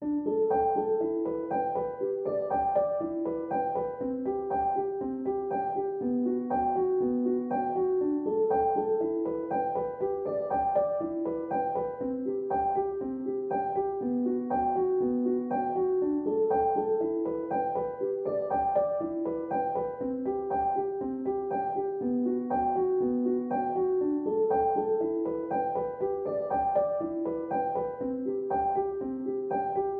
Music > Solo instrument
Piano loops 184 octave up long loop 120 bpm
120, 120bpm, free, loop, music, piano, pianomusic, simple, simplesamples